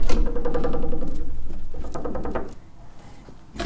Sound effects > Other mechanisms, engines, machines
Handsaw Beam Plank Vibration Metal Foley 14
twang, metal